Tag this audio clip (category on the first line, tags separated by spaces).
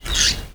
Sound effects > Animals
Spring H5 weird Outdoor Ambience April Village 2025